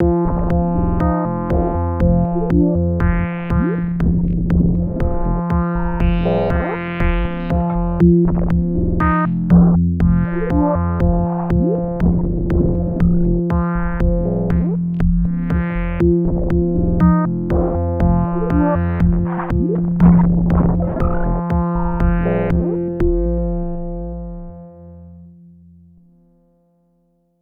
Music > Solo percussion
Drum loop with bit crushing #002 120bpm
120-bpm, 120bpm, bit-crushing, drum-loop, loop, percussion-loop, rhythm